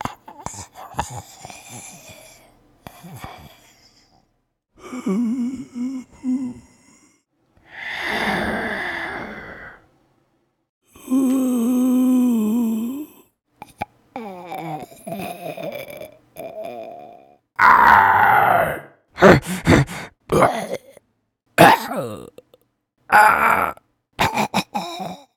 Sound effects > Human sounds and actions
Zombie groan, angry, and dead
I made these sound effects for a game jam called Night Walk. 🎤recording devices used.
dead, ghoul, groan, growl, grunt, horror, moan, monster, undead, zombie, zombies